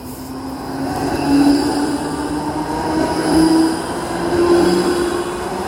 Sound effects > Vehicles
tram rain 04
motor, rain, tram